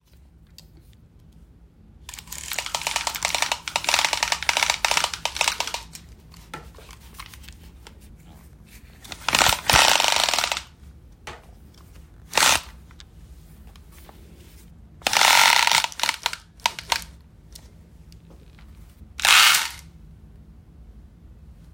Sound effects > Objects / House appliances
Hair Dryer cord 1
A hair dryer cord is pulled out of the body of a hairdryer from its retracted position.